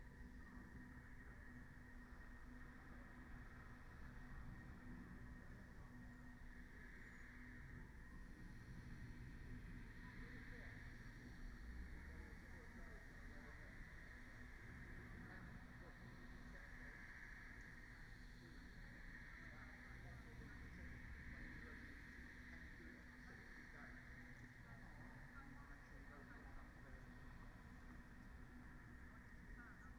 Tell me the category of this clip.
Soundscapes > Nature